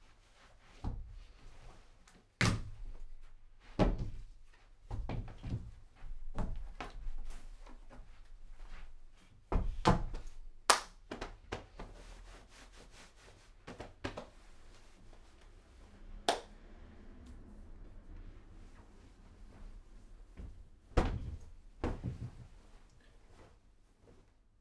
Sound effects > Objects / House appliances
People interaction noise - 3
NT5, objects, noise, noises, presence, Interaction-noise, stuff, person, room, XY, indoor, Rode, Tascam, FR-AV2, solo-crowd